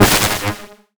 Instrument samples > Synths / Electronic
CINEMABASS 1 Ab
fm-synthesis, additive-synthesis, bass